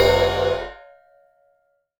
Music > Solo instrument
Zildjian 16 inch Crash-008
16inch, Crash, Custom, Cymbal, Cymbals, Drum, Drums, Kit, Metal, Oneshot, Perc, Percussion, Zildjian